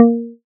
Instrument samples > Synths / Electronic
APLUCK 2 Bb
additive-synthesis, fm-synthesis, pluck